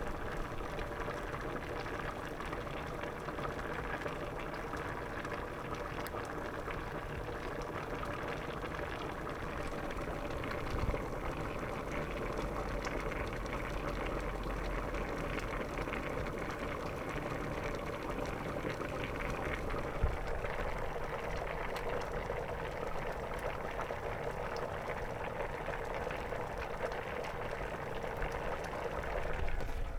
Sound effects > Objects / House appliances
Just beans boiling in the stove in a pot. Recorded with Zoom H2.